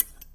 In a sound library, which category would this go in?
Sound effects > Objects / House appliances